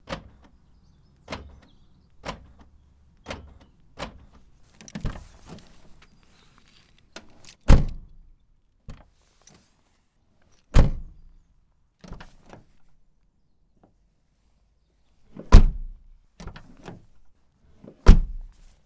Sound effects > Vehicles
Car Doors Opening and Unlocking
Just opening and closing the doors on a car, as well as locking and unlocking it. Recorded with a 1st Generation DJI Mic and Processed with ocenAudio
door, shut, lock